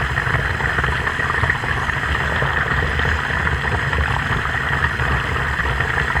Sound effects > Objects / House appliances
A looping version of a recent hydrophone recording requested by a fellow member.
bathrrom, hydrophome, sink, tap, water